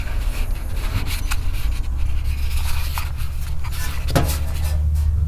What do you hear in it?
Sound effects > Other mechanisms, engines, machines
Woodshop Foley-087
knock, fx, tools, wood, tink, metal, oneshot, shop, rustle, perc, thud, percussion, sound, strike, boom, pop, sfx, bop, foley, bang, little, bam, crackle